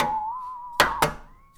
Sound effects > Other mechanisms, engines, machines
Handsaw Pitched Tone Twang Metal Foley 13
vibe, vibration, twang, hit, saw, foley, handsaw, plank, fx, percussion